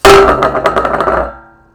Sound effects > Objects / House appliances
METLImpt-Blue Snowball Microphone, CU Bucket, Drop, Spin Nicholas Judy TDC
A metal bucket drop and spin.